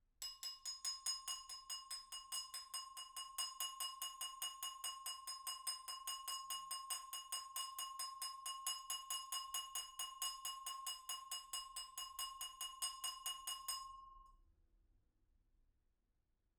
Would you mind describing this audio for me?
Sound effects > Other
Glass applause 16
A series of me recording multiple takes in a medium sized bedroom to fake a crowd. Clapping/talking and more original applause types, at different positions in the room. This glass applause series was done with different glasses and coffee spoons. With hindsight, I should have done some with water in them... Recorded with a Rode NT5 XY pair (next to the wall) and a Tascam FR-AV2. Kind of cringe by itself and unprocessed. But with multiple takes mixed it can fake a crowd. You will find most of the takes in the pack.
indoor, wine-glass, cling, NT5, stemware, single, Rode, FR-AV2, glass, person, individual, applause, clinging, solo-crowd, XY, Tascam